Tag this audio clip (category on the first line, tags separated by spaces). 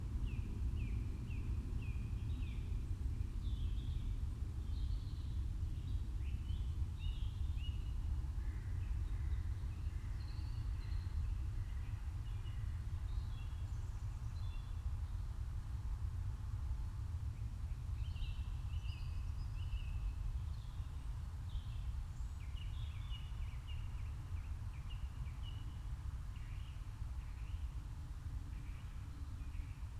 Soundscapes > Nature
meadow natural-soundscape phenological-recording raspberry-pi soundscape